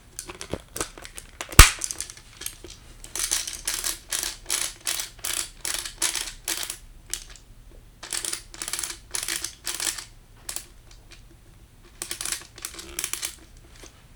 Sound effects > Objects / House appliances
Airsoft MP5 Mag charging the spring feeder - Djimic3 Split mono
Reminder to never point anything gun like (toy or not) at people. If you know it's empty, if you know it's safe, please still practice good gun handling and respect. Subject : A electric airsoft MP5. Date YMD : 2026 January 23 Location : France Indoors. Hardware : DJI MIC 3 left = Trigger/motor. Dji mic 3 Right = Barrel mic. Weather : Processing : Trimmed and normalised in Audacity. Notes : Tips : There were 4 mics (NT5 Overhead, NT5 Motor/trigger, DJi MIC 3 trigger/motor, Dji Mic 3 barrel exit). No stereo pair really, but two recordings are grouped as a mono pair for safe-keeping and timing/sync. I suggest you mess with splitting /mixing them to mono recordings. If you know it's empty, if you know it's safe, please still practice good gun handling and respect.
MP5, Air-soft, DJI, Airsoft, spring, Charging, MIC3, feeding, Mono, winding, Mag